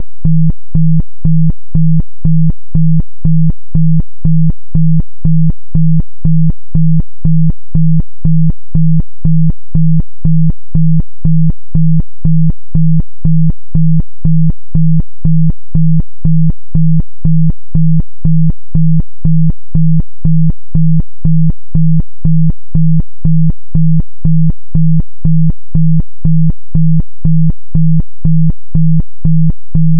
Sound effects > Experimental
Dual color split waveform test 3
Warning LOUD. Trying to split the color of the waveform preview horizontally, top and bottom having a different colors. By using some DC offset (made with the "Even harmonics" distortion on Audacity. First test was with a mix of two frequencies, each with a DC offset on opposing phase. Second test was just a DC offset of one frequency (it worked, transparency on one side, colour on the other) Third test I re-tried two frequencies, this time I added a square wave tremolo on each. Idea was the DC offset of each would apply a color to top and bottom. And the Tremolo would rapidly switch between each colour/side. Theoretically resulting in a cycle of top blue, bottom red. Then I was hoping on a long sound, it would compress the waveform so that cycling of colors would no longer be visible and just appear as one. Which it did, but not Top blue bottom red, just a mix of both resulting in a yellow ish waveform.
Sound-to-image, color, DC-Offset, freesound20, Preview